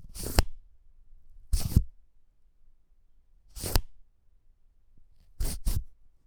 Sound effects > Objects / House appliances
Plastic coat hanger with adjustable clippers - sliding
Subject : Sliding the clips on a adjustable plastic clothes hanger. Date YMD : 2025 04 Location : Gergueil France. Hardware : Tascam FR-AV2, Rode NT5 Weather : Processing : Trimmed and Normalized in Audacity.